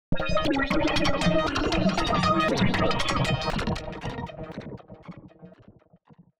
Sound effects > Electronic / Design
Optical Theremin 6 Osc Shaper Infiltrated-008
Noise, Loopable, Mechanical, DIY, Robotic, Synth, Pulse, Electro, strange, Experimental, SFX, Theremin, FX, Crazy, IDM, Alien, Robot, Chaotic, Machine, Gliltch, Analog, Oscillator, Otherworldly, Electronic, Saw, EDM, Weird, Impulse, Tone